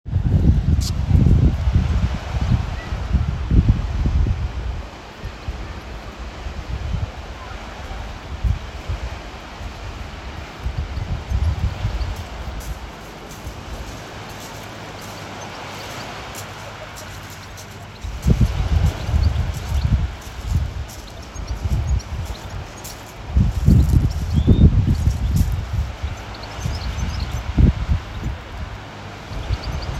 Soundscapes > Nature
Close to the beach sea listen
This was recorded on a beach in Cornwall recorded on an iPhone 14.
beach, sea